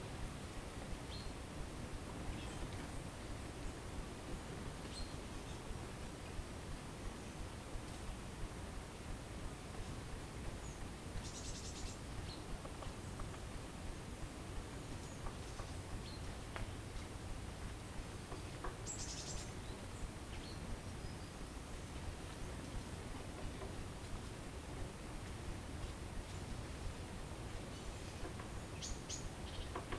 Soundscapes > Nature
20250910 15h00 Gergueil North water spot (Q4)
Subject : Ambience recording from a water source in a northern field at Gergueil. Date YMD : 2025 September 10 starting 15h00 Location : Gergueil 21410 Bourgogne-Franche-Comte Côte-d'Or France Hardware : Zoom H2n XY mode. Weather : Processing : Trimmed and normalised in Audacity.
21410, afternoon, Bourgogne, Bourgogne-Franche-Comte, Cote-dOr, country-side, field, France, garden, Gergueil, H2n, pond, rural, XY, Zoom